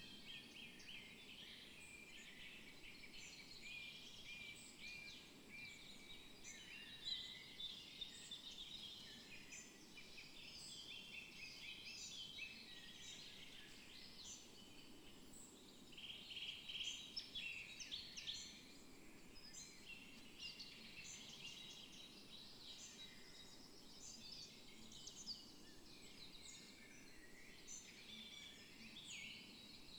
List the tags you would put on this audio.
Soundscapes > Nature
sound-installation
artistic-intervention
field-recording
soundscape
weather-data
modified-soundscape
alice-holt-forest
natural-soundscape
Dendrophone
phenological-recording
data-to-sound
nature
raspberry-pi

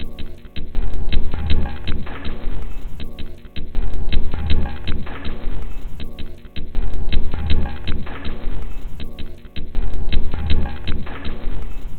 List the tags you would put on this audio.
Instrument samples > Percussion
Packs
Samples
Loopable
Loop
Underground
Weird
Alien
Soundtrack
Ambient
Dark
Industrial
Drum

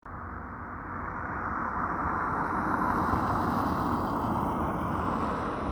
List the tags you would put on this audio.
Sound effects > Vehicles
car
engine
vehicle

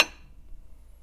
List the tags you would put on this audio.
Sound effects > Objects / House appliances
mechanical
sfx
hit
natural
fx
drill
metal
glass
fieldrecording
clunk
percussion
oneshot
industrial
object
foundobject
bonk
perc
stab
foley

